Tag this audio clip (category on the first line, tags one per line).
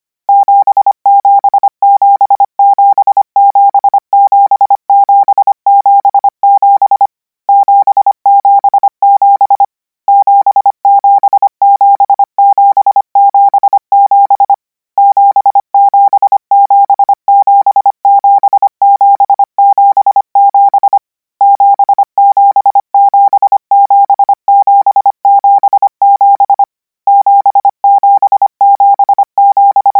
Sound effects > Electronic / Design
numbers
morse
numeros
code
radio
codigo